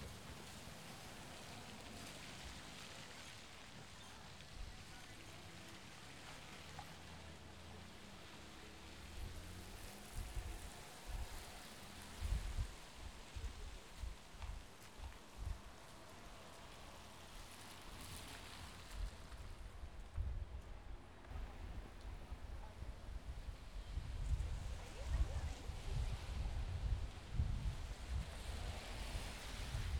Soundscapes > Urban
cars on the street